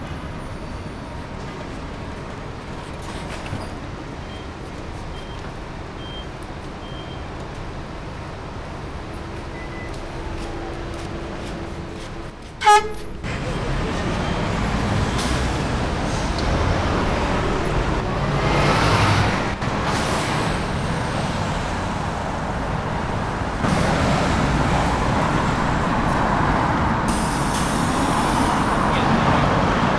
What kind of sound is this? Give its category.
Soundscapes > Urban